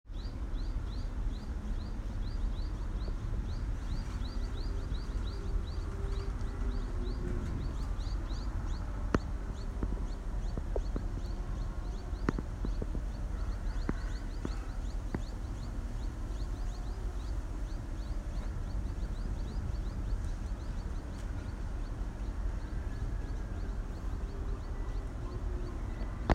Sound effects > Animals
Bird sound. Record use iPhone 7 Plus smart phone 2025.11.21 14:54